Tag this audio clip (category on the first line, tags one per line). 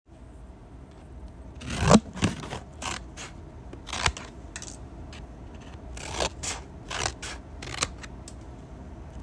Sound effects > Human sounds and actions
continue
cut
paper